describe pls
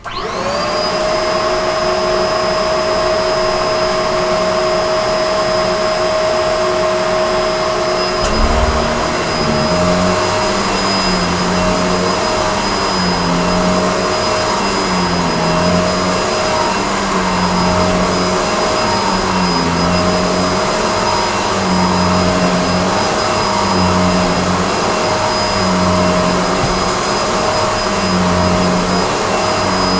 Sound effects > Objects / House appliances
MACHAppl-Blue Snowball Microphone Vacuum Cleaner, On, Running, Vacuuming, Off Nicholas Judy TDC
A vacuum cleaner turns on, running, vacuuming and turns off.
Blue-brand, Blue-Snowball, run, turn-off, turn-on, vacuum